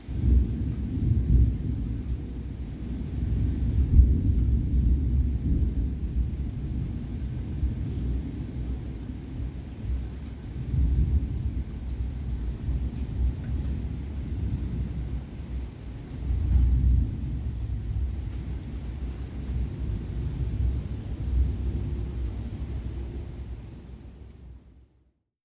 Sound effects > Natural elements and explosions
THUN-Samsung Galaxy Smartphone, MCU Booms Nicholas Judy TDC
Thunder booms in distance.
thunder, Phone-recording, distance